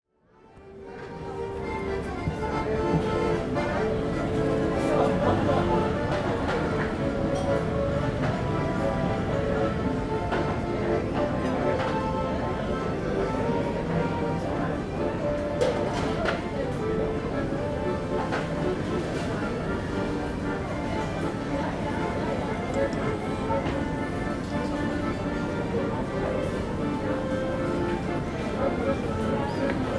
Soundscapes > Urban
Alley Streetmusic Greece
ambience with streetmusic in greece at a coast, restaurants, people..
chatter, streetmusic, greece